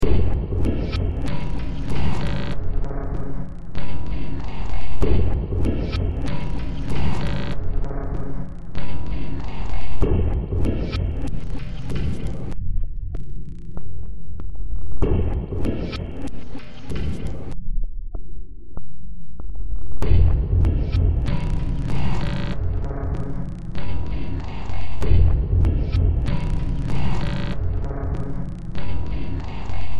Music > Multiple instruments
Demo Track #3198 (Industraumatic)
Industrial, Cyberpunk, Ambient, Noise, Soundtrack, Sci-fi, Underground, Games, Horror